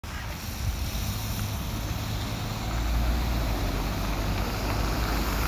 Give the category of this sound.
Soundscapes > Urban